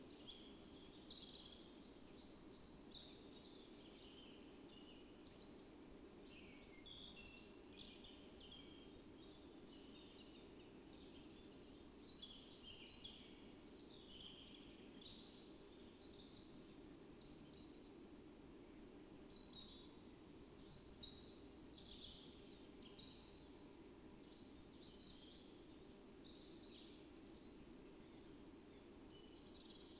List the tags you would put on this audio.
Soundscapes > Nature
raspberry-pi soundscape Dendrophone natural-soundscape artistic-intervention

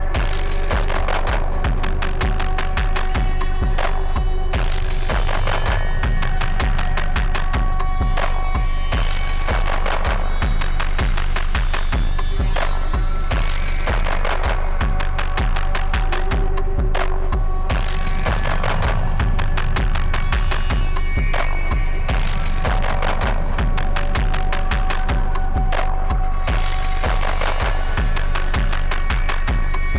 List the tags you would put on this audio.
Music > Other
interrupted pulsating Ambient Incidental breakbeat Experimental rhythm syncope

